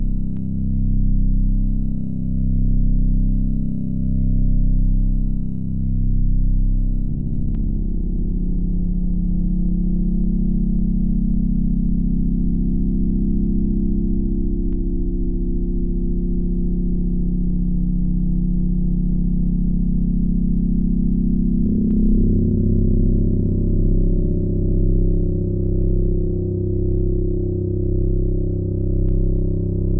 Soundscapes > Synthetic / Artificial
Quasi Drone – A Low Frequency Ambient Drone Soundscape
A slowly evolving dual-drone texture built from two oscillators tuned slightly apart, creating a gentle beating and internal motion. The combined signal passes through a ladder filter that softens the harmonics while adding a subtle analog-style weight. Each drone is panned opposite the other, forming a wide stereo field where small pitch differences and filter movement produce a sense of depth, tension, and calm instability.
ambient, low-frequency, miRack